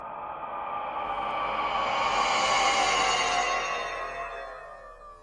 Sound effects > Other
Monster Death
This sound has been made by me in Audacity! Use it anywhere you want!
pain, death, creature, whisper, breath, monster